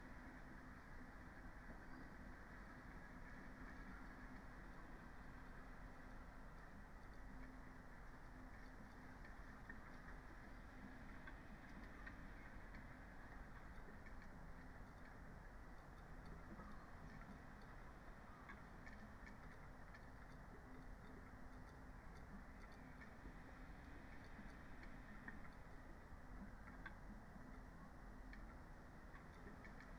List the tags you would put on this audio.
Soundscapes > Nature
soundscape
Dendrophone
raspberry-pi
natural-soundscape

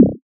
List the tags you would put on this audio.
Instrument samples > Synths / Electronic
additive-synthesis
fm-synthesis
bass

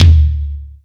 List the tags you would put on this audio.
Instrument samples > Percussion
drums
bubinga
tom-tom
attack
strenght
rock
bass
tom
DW
Tama
16x16-inch
floortom-1
heavy
quilted
impact
cylindrical-unsnared-drum
percussion
thrash
pop

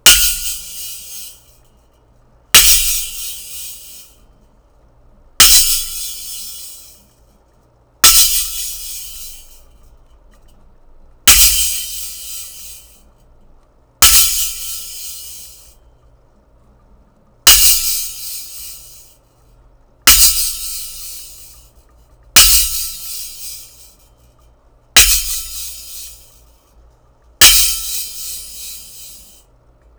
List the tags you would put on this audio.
Music > Solo percussion
crash
desktop
drums
Blue-Snowball
Blue-brand
cymbal

